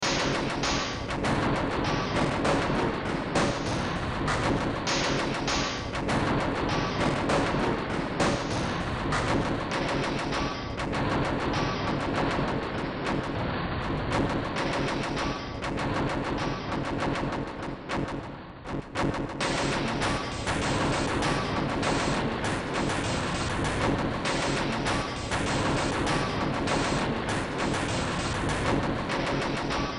Music > Multiple instruments
Demo Track #3628 (Industraumatic)
Ambient, Cyberpunk, Games, Horror, Industrial, Noise, Sci-fi, Soundtrack, Underground